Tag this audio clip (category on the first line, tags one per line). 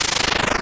Sound effects > Other
synthesized
damage
critical